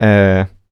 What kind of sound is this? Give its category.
Speech > Solo speech